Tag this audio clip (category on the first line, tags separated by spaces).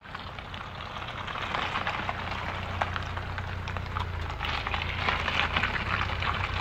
Sound effects > Vehicles
car combustionengine driving